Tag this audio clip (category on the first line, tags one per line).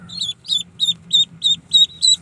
Sound effects > Animals
poultry
duckling
bird
waterfowl